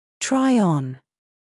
Speech > Solo speech
try on
english, pronunciation, voice, word